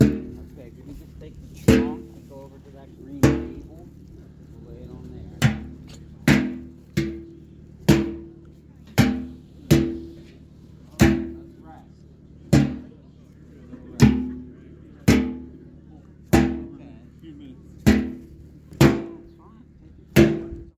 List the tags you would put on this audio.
Sound effects > Objects / House appliances
big
clank
metal
pail
Phone-recording

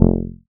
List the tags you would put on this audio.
Instrument samples > Synths / Electronic
bass
fm-synthesis

